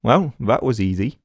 Speech > Solo speech
Cocky - Well that was easy
sentence, singletake, Vocal, Male, oneshot, smug, talk, U67, voice, NPC, dialogue, cocky, FR-AV2, Single-take, Voice-acting, Human, Tascam, Man, Neumann, Mid-20s, Video-game